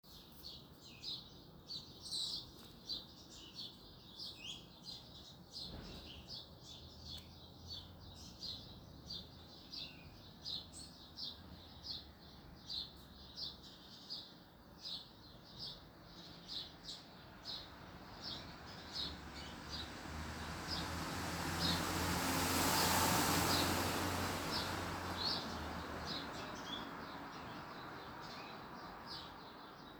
Soundscapes > Urban
NKC morning
This was recorded on a Samsung Galaxy of the sounds of the city and birds in the early morning in West Africa. We can hear a lorry unloading, passing traffic and mostly birds.
Domestic, passing-traffic, city-sounds, birdsong, nature, birds, bird, field-recording, West-Africa, Africa, garden-sounds, African-city-sounds, ambiance